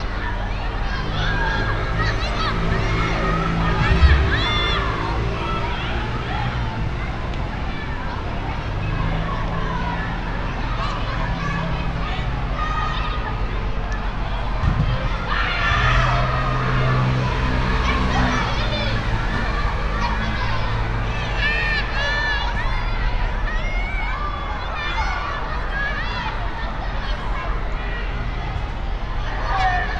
Soundscapes > Urban
City of Ghent 3
Soundscape of the city of Ghent. December 2025. Recorded with Stogie microphones in a Zoom F3.
belgium, ambiance, city, gent